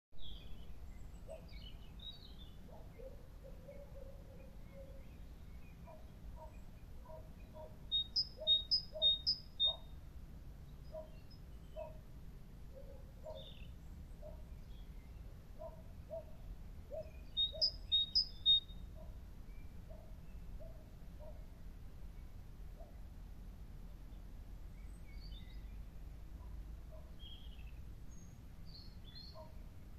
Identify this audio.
Sound effects > Animals
A peaceful stereo field recording captured during a morning walk in the park. Features natural birdsong and the occasional distant bark from a dog, with minimal background noise. Great for use in ambient music layers, sound design, meditation videos, or nature-inspired scenes.